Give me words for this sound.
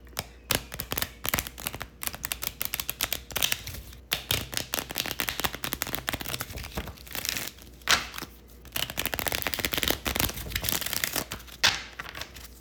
Sound effects > Objects / House appliances
The cards are shuffled, and the hits are fast and constant. The paper mixes and strikes the hollow wooden surface, which resonates with an echo, amplifying each movement with a vibrant, rhythmic thud.